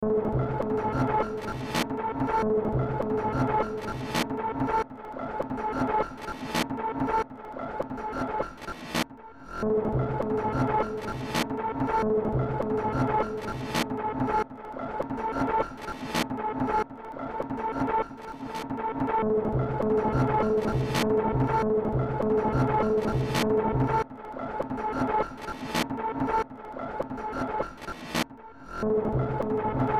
Music > Multiple instruments
Short Track #3782 (Industraumatic)
Ambient, Games, Soundtrack, Underground